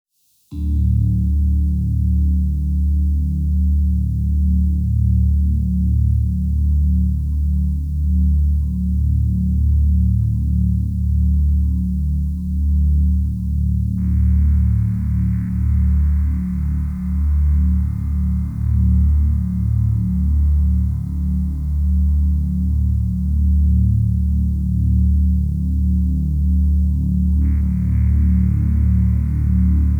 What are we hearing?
Soundscapes > Synthetic / Artificial
magnetic drone
ambient drone soundscape made with Clavia Nordstage, Roland Juno-106+Zoom 9030 multieffect
ambient, sound, divine, multisample, dystopic, dark, horror, cinematic, atmosphere, film, pad, freaky, deep, sci-fi, space, dreamy, experimental